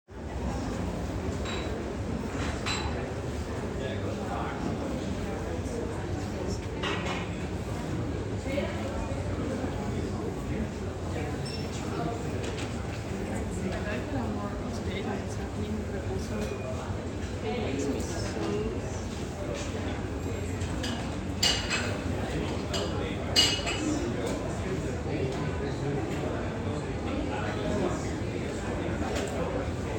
Soundscapes > Indoors
Shop intern walla Dutch cash register book shop bar restaurant kitchenware 2026-01 HZA
iPhone 6 stereo recording of Dutch walla in a busy bookshop. Cash register can be heard. Also coffee cups and bar sounds, as this was recorded near the cafe of the store.
ambience, bar, chatter, Dutch, people, restaurant, talking